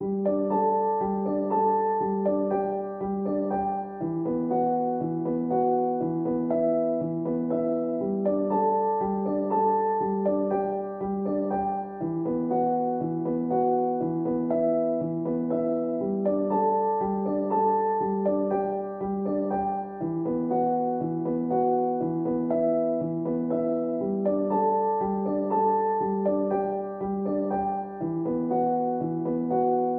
Music > Solo instrument
Piano loops 197 octave long loop 120 bpm
120, 120bpm, free, loop, music, piano, pianomusic, reverb, samples, simple, simplesamples